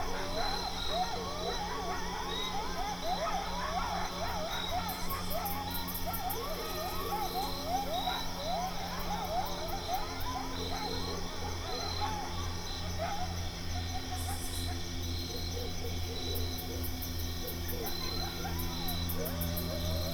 Soundscapes > Nature
pack of coyotes freak out as a plane flies overhead
A pack of coyotes bark and howl as a low-flying plane cruises by overhead. Recorded with a Zoom H5, cleaned up a little in FL Studio.
coyotes, barking, howling, soundscape, drone, plane, pack, nature, field-recording